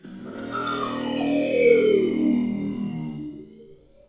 Sound effects > Experimental
Creature Monster Alien Vocal FX-32
Otherworldly, sfx, gutteral, boss, Vox, Growl, Alien, Ominous, evil, Animal, Frightening, Echo, Deep, Fantasy, fx, Monstrous, devil, Snarl, Reverberating, Sound, Snarling, demon, Monster, scary, visceral, Sounddesign, gamedesign, Creature, Groan, Vocal